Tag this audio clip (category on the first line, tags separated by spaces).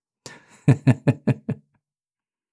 Sound effects > Human sounds and actions
giggle giggling laugh laughter